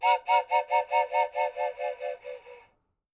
Sound effects > Objects / House appliances
TOONVox-Blue Snowball Microphone, CU Laughing, Train Nicholas Judy TDC
A laughing train whistle.
Blue-brand, Blue-Snowball, cartoon, laugh, train, whistle